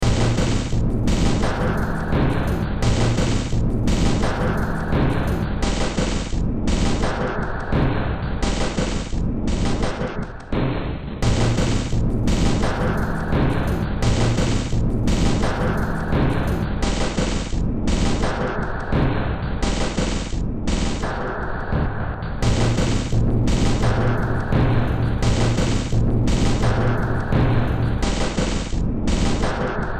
Music > Multiple instruments
Short Track #3830 (Industraumatic)
Ambient, Cyberpunk, Games, Horror, Industrial, Noise, Sci-fi, Soundtrack, Underground